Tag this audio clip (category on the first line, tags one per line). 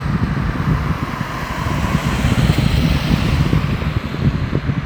Soundscapes > Urban
car
city
driving
tyres